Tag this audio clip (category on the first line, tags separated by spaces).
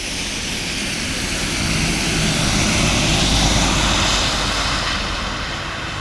Sound effects > Vehicles
bus transportation vehicle